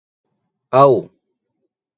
Sound effects > Other
wow-sisme
arabic, male, sound, vocal, voice